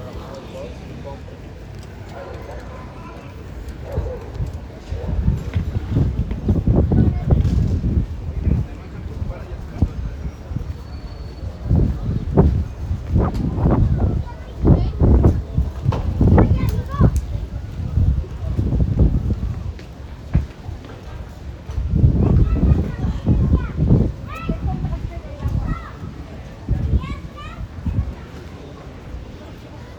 Soundscapes > Nature
AMBPark ambience park day DOI FCS2
an afternoon in the park